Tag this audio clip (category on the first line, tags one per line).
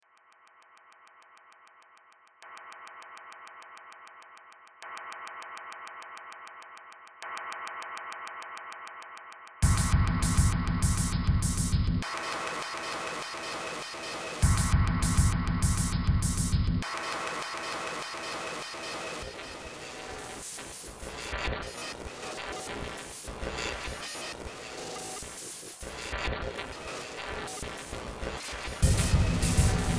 Music > Multiple instruments
Sci-fi
Horror
Noise
Underground
Industrial
Ambient
Soundtrack
Games
Cyberpunk